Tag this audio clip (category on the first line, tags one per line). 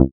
Instrument samples > Synths / Electronic
additive-synthesis
bass
fm-synthesis